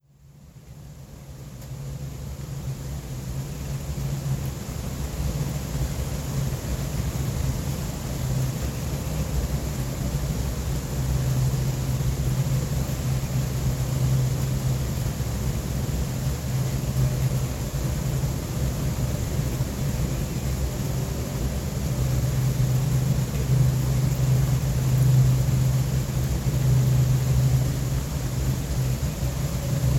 Soundscapes > Nature
Raining, sounds of overhead jet, thunder or wind noises.